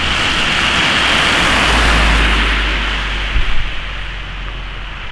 Sound effects > Vehicles
Car00060512CarSinglePassing
automobile, car, drive, field-recording, rainy, vehicle